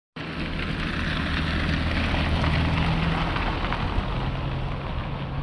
Sound effects > Vehicles
old citroen
Car, field-recording, Tampere